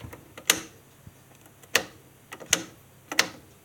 Sound effects > Other mechanisms, engines, machines
A switch being switched (a switch can switch?!?!) Recorded with my phone.